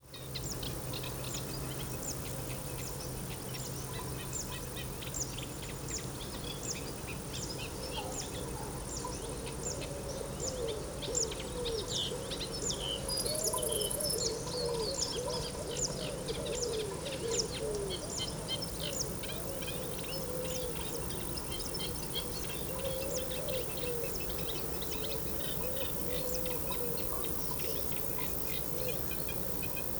Soundscapes > Nature
Large Meadow in a sunny summer afternoon in a swarmy field, near the river "La vilaine". Insects as flies and orthoptera, birds are (according to Merlin): wood pigeon, turkish turtle, goldfinch, zitting cisticola, ...), a small shepp's bell, and other discreet presences. Rich and lively ambiance.